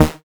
Instrument samples > Synths / Electronic
CINEMABASS 4 Eb
additive-synthesis, bass, fm-synthesis